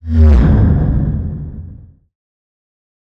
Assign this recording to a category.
Sound effects > Other